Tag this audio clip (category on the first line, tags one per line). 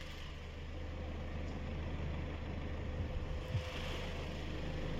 Sound effects > Other mechanisms, engines, machines
Auto
Avensis